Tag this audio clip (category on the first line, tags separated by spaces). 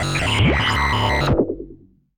Sound effects > Experimental
alien analog analogue bass basses bassy complex dark effect electro electronic fx korg machine mechanical oneshot pad retro robot robotic sample sci-fi scifi sfx snythesizer sweep synth trippy vintage weird